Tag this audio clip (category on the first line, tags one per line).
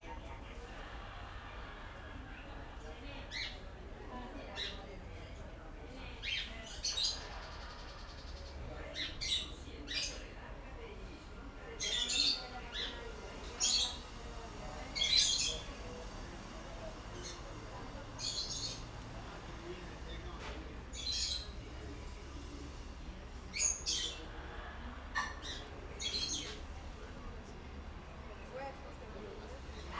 Sound effects > Human sounds and actions
ambience
background
foley
mallorca
town